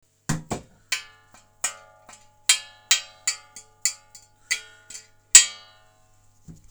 Sound effects > Objects / House appliances
Pan Percussion
Sound created hitting metal sieve pan with spoon.
Kitchen, Metal